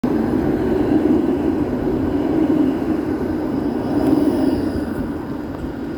Sound effects > Vehicles
15tram intown
A tram is passing by in the city center of Tampere. Recorded on a Samsung phone.
city-center, public-transport, tram